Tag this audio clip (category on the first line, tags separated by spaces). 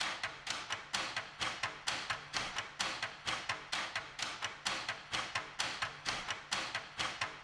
Music > Solo percussion
129bpm percussion loop temple analog claps 1ovewav